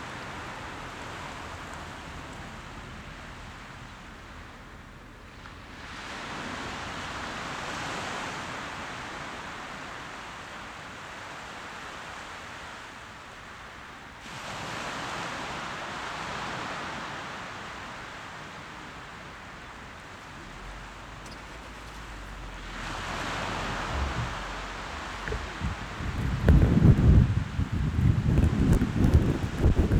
Soundscapes > Nature
Beach Ambience
beach, coast, field-recording, ocean, sea, sea-shore, seaside, shore, surf, water, waves